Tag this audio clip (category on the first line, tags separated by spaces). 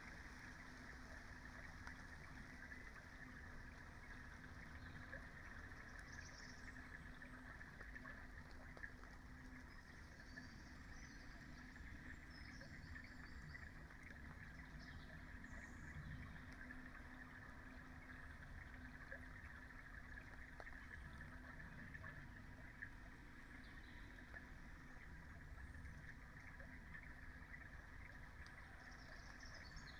Soundscapes > Nature
soundscape modified-soundscape Dendrophone sound-installation phenological-recording raspberry-pi nature field-recording alice-holt-forest data-to-sound weather-data artistic-intervention natural-soundscape